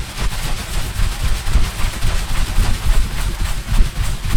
Sound effects > Other
Rolling In Grass Loop
A loopable audio that sounds like something rolling across a lawn or soft surface. Made by rubbing a towel against my shirt Recorded with MAONO AU-A04TC USB Microphone
reel, roll, rolling, rotate, sand, scroll, scurry, spin, turn, wheel